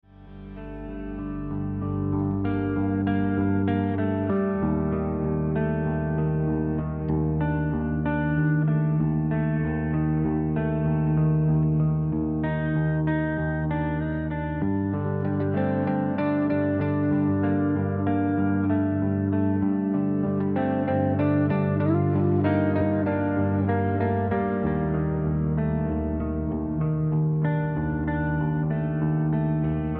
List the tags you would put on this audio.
Music > Other
BM depressive electric guitar sample

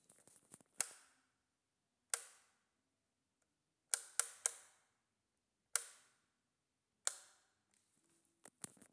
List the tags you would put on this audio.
Sound effects > Other mechanisms, engines, machines
Click Switch

